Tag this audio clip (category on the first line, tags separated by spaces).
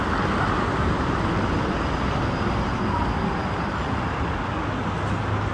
Sound effects > Vehicles
Tram city urban